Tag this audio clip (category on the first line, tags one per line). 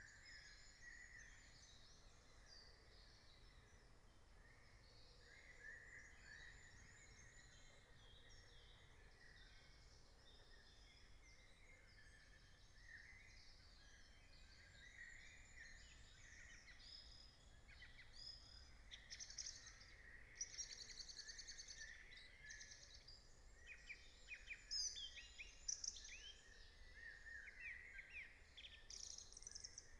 Soundscapes > Nature
alice-holt-forest
artistic-intervention
data-to-sound
Dendrophone
field-recording
natural-soundscape
phenological-recording
raspberry-pi
sound-installation
soundscape
weather-data